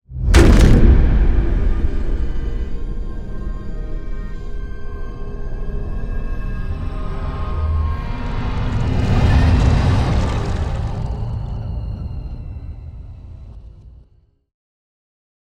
Sound effects > Other
Sound Design Elements SFX PS 061
cinematic, reveal, metal, impact, transition, indent, boom, explosion, sub, implosion, sweep, riser, tension, trailer, video, industrial, game, hit, stinger, movement, effect, epic, whoosh, bass, deep